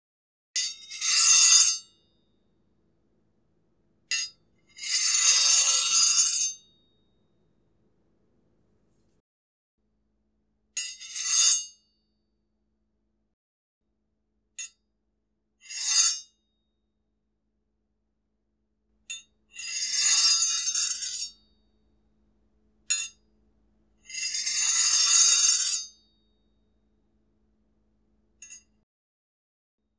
Sound effects > Objects / House appliances
silverware knife light sword shing sounds Heaven feel 10192025
raw recording of silverware knives slide against each other. Create this movie quality sword slide sound.